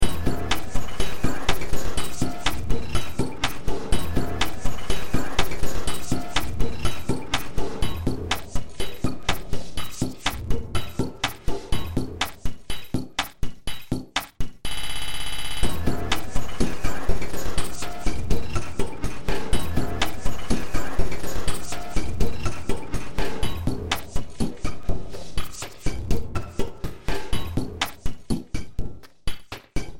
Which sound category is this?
Music > Multiple instruments